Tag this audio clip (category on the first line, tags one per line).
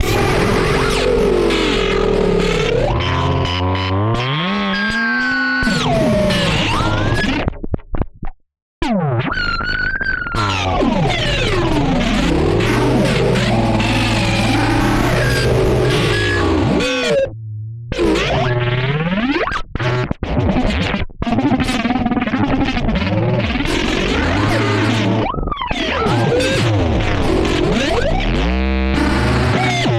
Sound effects > Electronic / Design
Alien
Analog
Chaotic
Crazy
DIY
EDM
Electro
Electronic
Experimental
FX
Gliltch
IDM
Impulse
Loopable
Machine
Mechanical
Noise
Oscillator
Otherworldly
Pulse
Robot
Robotic
Saw
SFX
strange
Synth
Theremin
Tone
Weird